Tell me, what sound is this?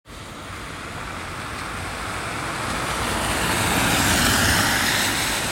Sound effects > Vehicles
car rain 02
rain, engine, vehicle, car